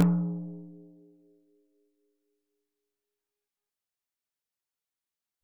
Music > Solo percussion
Hi Tom- Oneshots - 24- 10 inch by 8 inch Sonor Force 3007 Maple Rack
acoustic, beat, beatloop, beats, drum, drumkit, drums, fill, flam, hi-tom, hitom, instrument, kit, oneshot, perc, percs, percussion, rim, rimshot, roll, studio, tom, tomdrum, toms, velocity